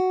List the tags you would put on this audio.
Instrument samples > String
arpeggio
design
guitar
cheap
tone
stratocaster
sound

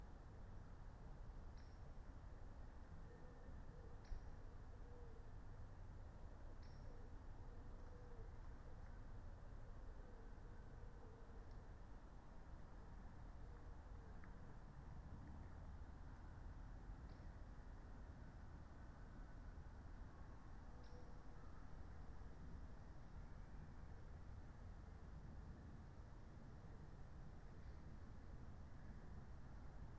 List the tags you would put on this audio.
Soundscapes > Nature
alice-holt-forest
artistic-intervention
Dendrophone
field-recording
modified-soundscape
natural-soundscape
phenological-recording
raspberry-pi
sound-installation
soundscape
weather-data

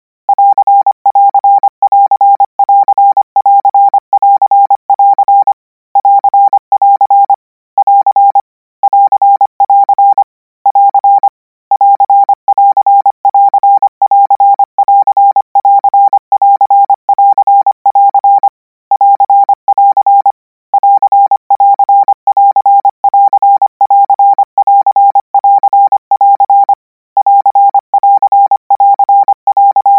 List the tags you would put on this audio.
Sound effects > Electronic / Design
symbols
codigo
code

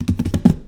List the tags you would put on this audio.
Sound effects > Objects / House appliances
liquid
knock
spill
shake
clatter
garden
tool
pail
bucket
debris
scoop
pour
clang
foley
lid
cleaning
slam
kitchen
drop
handle
household
fill
hollow
container
object
metal
carry
plastic
water
tip

